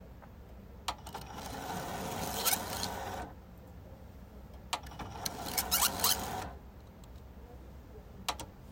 Sound effects > Other mechanisms, engines, machines
All sounds associated with a 35mm plastic microfilm scanner being loaded with microfilm, cranked, fast-forwarded and rewound. Actual research of vintage newspapers at a local library being performed for the recording.
35mm, archive, microscopic, screen, library, plastic, newspapers, light, microfilm, collection, documents, film, records, reading, scanner, images, optical, research, enlarge, books, reader